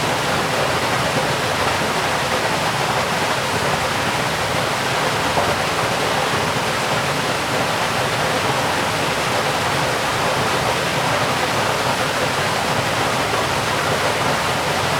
Soundscapes > Nature
Ambiance Old Mill Ribeira dos Caldeirões Loop Stereo 01
Old Mill - Close Recording - Loop Recorded at Ribeira dos Caldeirões, São Miguel. Gear: Sony PCM D100.